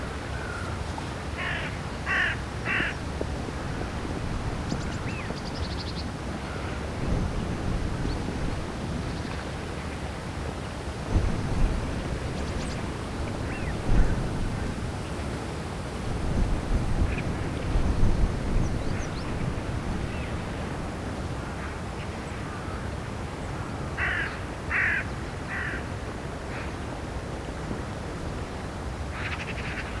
Soundscapes > Nature
Volga River
birds, field-recording, nature, river, Volga